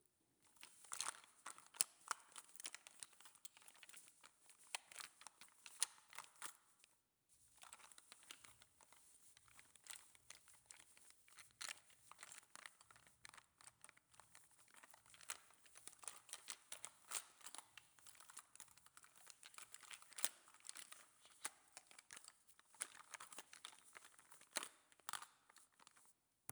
Sound effects > Human sounds and actions
OBJECTFashion makeup cloth disaster looking makeup bag NMRV FSC2
Looking inside of a makeup bag Makeup unorganized and desperate movements
Bag, Heavy, makeup